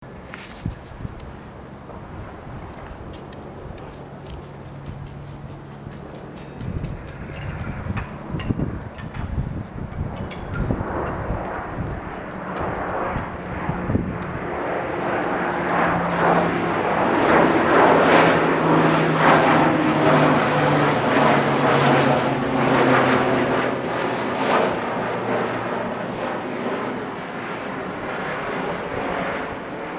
Sound effects > Other mechanisms, engines, machines
SWEARINGEN METROLINER Flyby 150Mts close.
Swearingen Metroliner N770TR Sierra West Airlines flyby, 150Mts close, last 2.77 miles for land procedure. Recorded with SONY IC Recorder. Mod. ICD-UX560F